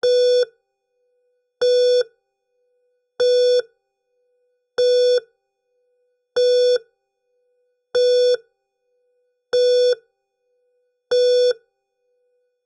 Sound effects > Electronic / Design
Synthed with phaseplant only.
Counting, telephone, Phone, Nuclear-boom, Countdown